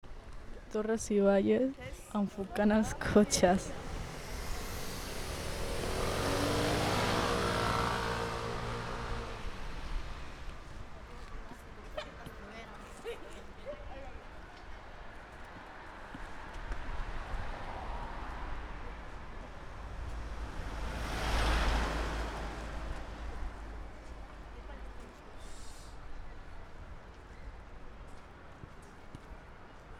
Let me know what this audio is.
Soundscapes > Urban
20251118 TorresiBages AndreuLucia
Urban Ambience Recording in collab IE Tramunta, Barcelona, Novembre 2025. Using a Zoom H-1 Recorder. In the context of "Iteneraris KM.0" Project.